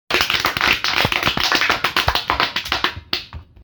Sound effects > Human sounds and actions
multiple people clapping (really just many recordings of me clapping combined)
clapping, clap, claps, handclap, applause